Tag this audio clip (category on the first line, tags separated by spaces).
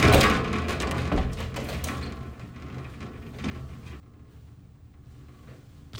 Soundscapes > Urban
resonance warehouse